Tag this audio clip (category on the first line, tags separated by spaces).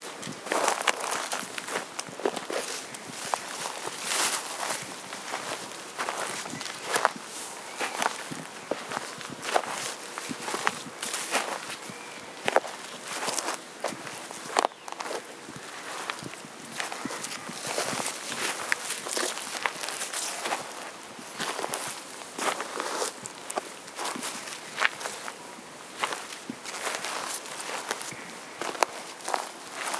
Soundscapes > Nature
dirt field-recording nature walking ambiance walk steps footsteps